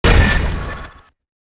Sound effects > Other
Sound Design Elements Impact SFX PS 087
transient, cinematic, heavy, design, sharp, hit, collision, smash, thudbang, force, hard, shockwave, effects, blunt, impact, power, game, sound, crash, explosion, audio, rumble, sfx, percussive, strike